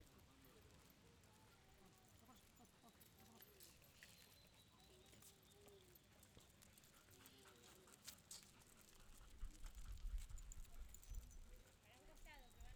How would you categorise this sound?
Soundscapes > Nature